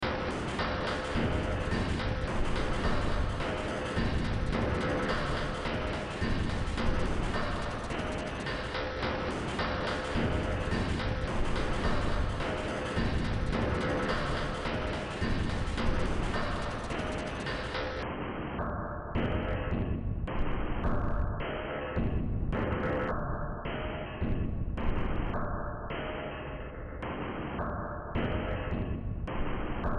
Music > Multiple instruments
Demo Track #3093 (Industraumatic)
Soundtrack, Underground, Ambient, Cyberpunk, Sci-fi, Horror, Industrial, Games, Noise